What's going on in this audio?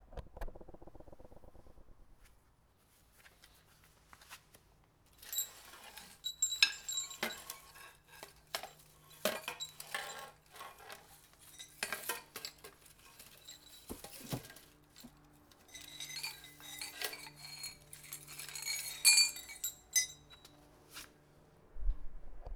Soundscapes > Other
Metal and plastic shuffled on concrete
Small metal tubes wrapped in plastic shuffled on a concrete floor. Recorded with a Zoom h1n.
tubes, concrete, shuffle, plastic, brush, soft, metal